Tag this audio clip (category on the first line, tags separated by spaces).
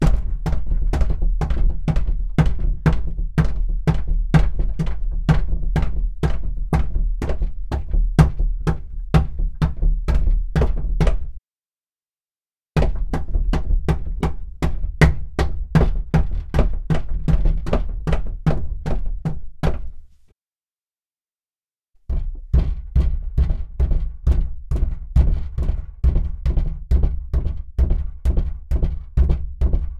Sound effects > Other
door hitting NSFW pounding Wall